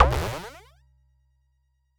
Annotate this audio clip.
Sound effects > Electronic / Design
GreyLaser Var-01
A grey alien shooting their laser pistol right at you - or at least, how I imagine that sounding. Variation 1 of 3 - the sound is slightly altered in many different places to add to any basic pitch modulation you'd like to use on top.